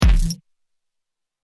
Instrument samples > Synths / Electronic
Guillotine Bass SHORT
Synth bass made from scratch The short version
Electronic, Oneshot, Bass